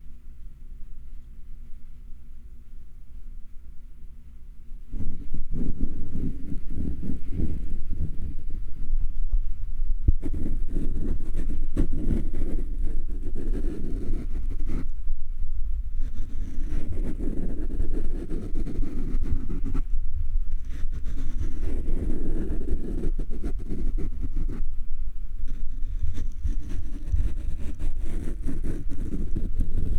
Sound effects > Other
I wanted to have a sample of a traditional scratching, brushing trigger that ASMRtists could loop, layer, and use (as well as anybody else, of course!) If you'd like them- I have some recommendations on using it for ASMR and relaxation oriented stuff! Using it as-is as a mono track is great, but I think it gets extra tingly when you layer it onto two tracks, offset the timing by anywhere from seconds to minutes, and set one track to 90% L and the other the 90% R (90% gets you a 'closer' sound with this than 100% L/R panning!) Would love to hear anything you use this in, but not required!